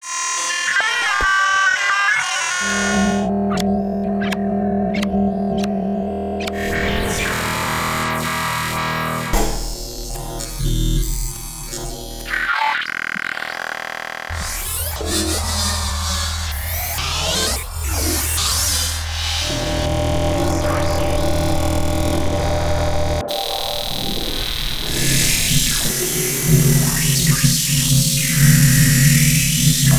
Sound effects > Experimental
Alien Animalia Sequence 4x75RQ
a strange sequence of alien glitchy sfx created using over 40 vsts instruments and effects , processed via Reaper
glitch, loopable, soundeffect, abstract, wtf, sfx, monsters, fx, synthetic, noise, monster, analog, lo-fi, digital, electronic, alien, strange, future, animal, otherworldly, hi-fi, sound-design, freaky, ambient, sci-fi, scifi, effect, weird, robotic